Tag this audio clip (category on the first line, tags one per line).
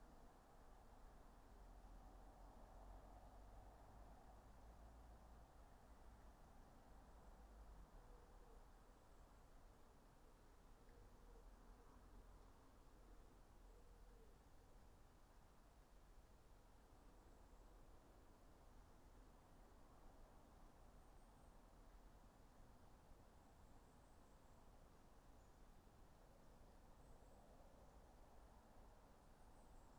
Soundscapes > Nature
artistic-intervention
soundscape
data-to-sound
alice-holt-forest
nature
modified-soundscape
field-recording
phenological-recording
raspberry-pi
weather-data
Dendrophone
natural-soundscape
sound-installation